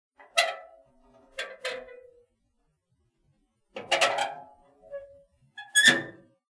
Sound effects > Other mechanisms, engines, machines
Metallic Squeeks
a series of metallic scrapes
squeak, metallic, scrape